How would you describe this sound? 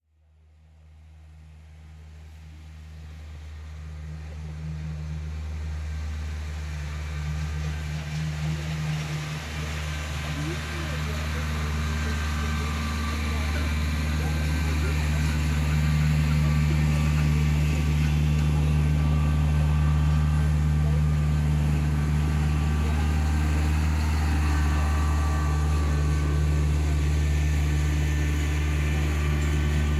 Sound effects > Vehicles
A tractor passing by slowly.